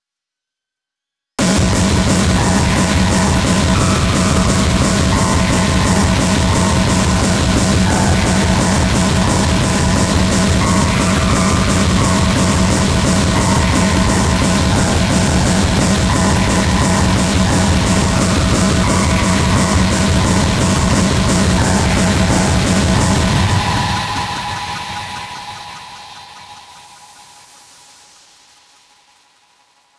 Music > Solo percussion
Simple Bass Drum and Snare Pattern with Weirdness Added 029
It's pretty much all in the name (and the tags). I took a simple 4/4 beat, snare on 1 and 3, bass on 2 and 4, and then I added erratic chains of effects that I primarily determined aleatorically. The result is sometimes noisy, sometimes it's fun or simply strange, but perhaps it could be useful to you in some way.